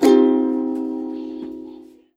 Music > Solo instrument
MUSCPluck-Samsung Galaxy Smartphone, CU Ukelele Strum Nicholas Judy TDC
A ukelele strum. Recorded at Goodwill.